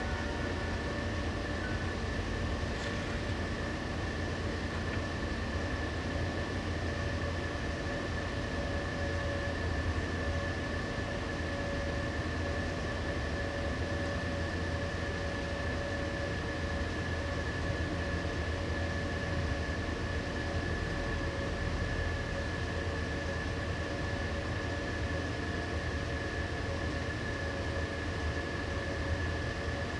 Soundscapes > Urban
A nearly empty parking garage. A couple of vehicles pass by and exit, but in between it is fairly quiet with some water sounds from pipes and such.
underground, vehicles, echo, cars, urban, city, field-recording, cement